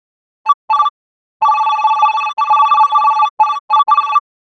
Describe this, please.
Sound effects > Electronic / Design
Fake Vintage Computer Terminal Text Output Bleeps
A sound that accompanies the output of text on a vintage computer terminal. We all saw this in sci-fi movies of the 70s and 80s, knowing that in real life there was no sound. Created by me in the Android app "Caustic" and manipulated in "Audacity".
print, computer, text, output, cinematic, scifi, artificial, console, terminal, bleeps